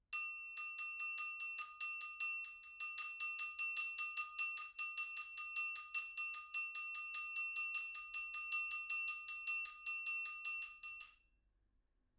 Sound effects > Other
Glass applause 14
applause, cling, clinging, FR-AV2, glass, individual, indoor, NT5, person, Rode, single, solo-crowd, stemware, Tascam, wine-glass, XY